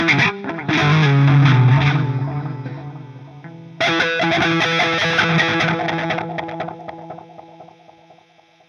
Instrument samples > String
Combination of notes "High-gain electric guitar lead tone from Amplitube 5, featuring a British Lead S100 (Marshall JCM800-style) amp. Includes noise gate, overdrive, modulation, delay, and reverb for sustained, aggressive sound. Perfect for heavy rock and metal solos. Clean output from Amplitube."